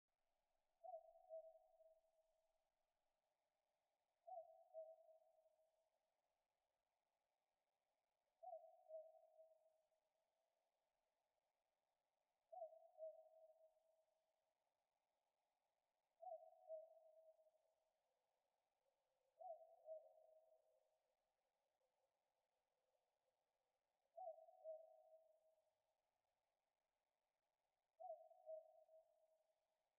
Sound effects > Animals
BIRDOwl Boobook Calls v01
recording of hte boobook owl that frequents our backyard in SA. It has been cleaned and treated.
SouthAustralia, Amb, bird, owl, Australia, bird-call, boobook, nature, Night